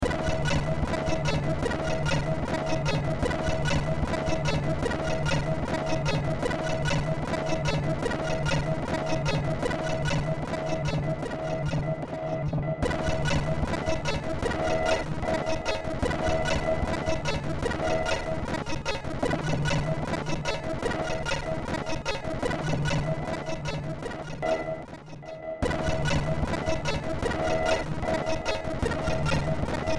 Music > Multiple instruments
Short Track #3523 (Industraumatic)
Underground, Industrial, Soundtrack, Cyberpunk, Noise, Horror, Games